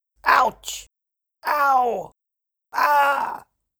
Speech > Solo speech
Ouch! Ow! Arggh!
Some different injury sound effects for an indie game. Voice i used sounds a bit more cutesy so i think could be used for a platformer game. However by playing with pitch you could probably use it for other stuff. Made by R&B Sound Bites if you ever feel like crediting me ever for any of my sounds you use. Good to use for Indie game making or movie making. This will help me know what you like and what to work on. Get Creative!
Ow, Ouch, Arghh